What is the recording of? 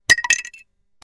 Sound effects > Objects / House appliances
Ice cube falling in glass 4
Ice cube falling into a glass
ice, drop, clinking, ice-cube, glass, rattle, dink, glasses, clink